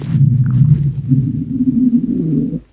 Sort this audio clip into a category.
Sound effects > Human sounds and actions